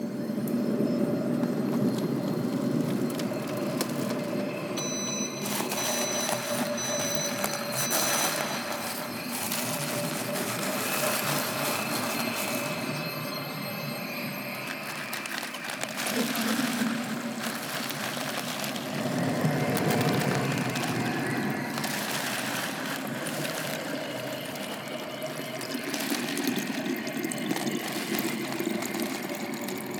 Soundscapes > Synthetic / Artificial
Ambient Sci Fi Walk
Walking on alien planet. recorded clips outside and layered them together . An otherworldly atmosphere ! No pre-made samples were used. This sound was created from original synthesis/recording